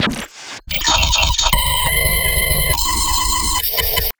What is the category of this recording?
Sound effects > Experimental